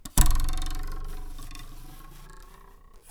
Sound effects > Other mechanisms, engines, machines
metal shop foley -126
bam, bang, boom, bop, crackle, foley, fx, knock, little, metal, oneshot, perc, percussion, pop, rustle, sfx, shop, sound, strike, thud, tink, tools, wood